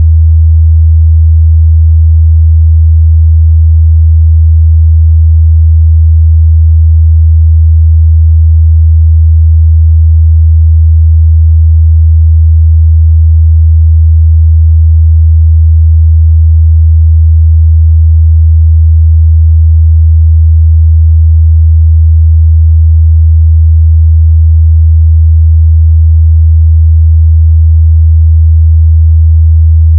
Sound effects > Experimental
Blue to Green Palette
Here are the 10 frequencies I did in hertz : (Blue starts at 80, any lower frequency doesn't result in a colour change) 80 100 120 150 200 250 300 375 420 500